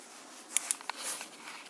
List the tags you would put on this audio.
Sound effects > Objects / House appliances
foley
household
cut
sfx
scissors
scrape
tools
snip

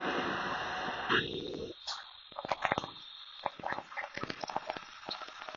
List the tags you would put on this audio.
Soundscapes > Urban
bus-stop
bus

